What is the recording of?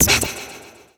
Sound effects > Electronic / Design
SFX Spell WhisperedShort-04
A short, intense whisper - just what did they just cast? Variation 4 of 4.
short, spell, speak, cast, vocal, voice, whisper